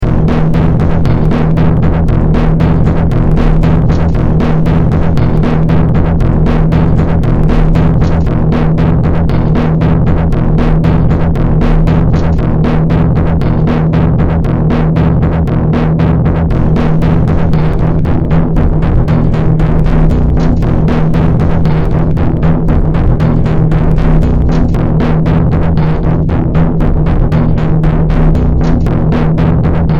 Music > Multiple instruments
Demo Track #3117 (Industraumatic)
Underground, Horror, Soundtrack, Games, Noise, Cyberpunk, Ambient, Industrial, Sci-fi